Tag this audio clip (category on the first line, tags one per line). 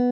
Instrument samples > String
arpeggio
cheap
design
guitar
sound
stratocaster
tone